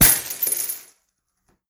Sound effects > Objects / House appliances
CERMBrk-Samsung Galaxy Smartphone, CU Mug, Ceramic, Small, Break Nicholas Judy TDC
A small ceramic mug break.